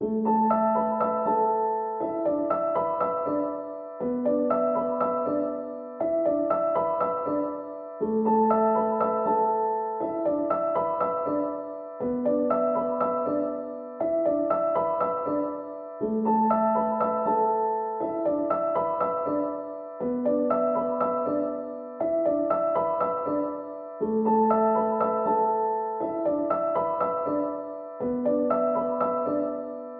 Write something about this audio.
Music > Solo instrument
Beautiful piano harmony inspired by the work of Danny Elfman. This sound can be combined with other sounds in the pack. Otherwise, it is well usable up to 4/4 120 bpm.